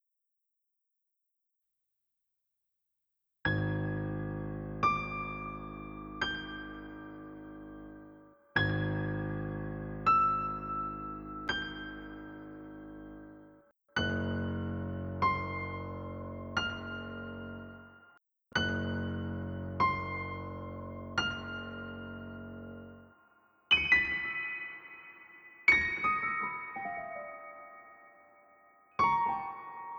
Music > Solo instrument
Horror piano sound effect
creepy, creepy-piano, horror, horror-piano, scary, scary-piano, sinister, spooky, suspense, terror